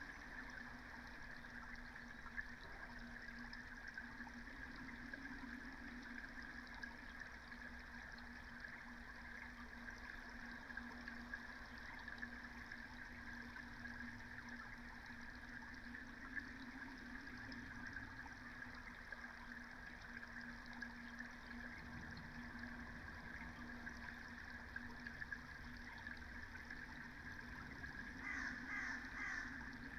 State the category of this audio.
Soundscapes > Nature